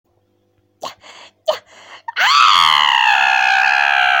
Sound effects > Human sounds and actions
Loud Bomby Scream
The Honor 7A phone device recorded his loud screaming, which sounded like he was screaming in a high-pitched voice! #0:02
Animation, Argh, Cartoon, Frightened, Loud, Original, Recording, Scream, Screaming, Screams, Sfx, Sound